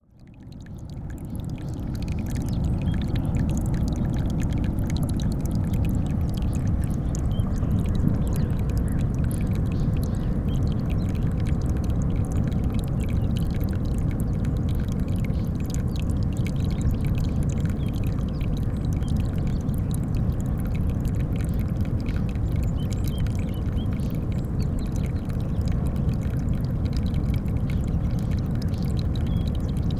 Soundscapes > Nature
A trickling stream near the beach.